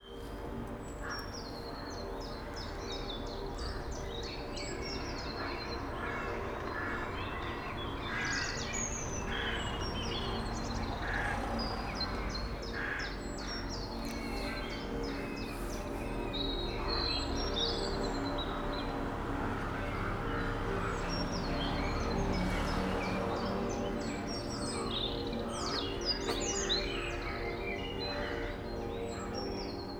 Soundscapes > Urban
20250413 0954 Kiel Koldingstr
Street Cafe on a quiet Sunday morning: birds singing, in a distance church bells, some pedestrians and distant traffic noise.
soundscape, town, street, field-recording, city, urban, ambience